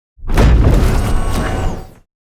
Sound effects > Other mechanisms, engines, machines
Sound Design Elements-Robot mechanism-019
digital, grinding, machine, metallic, robot, movement, clicking, operation, elements, powerenergy, synthetic, sound, servos, design, circuitry, motors, clanking, hydraulics, feedback, automation, mechanism, gears, mechanical, whirring, processing, actuators, robotic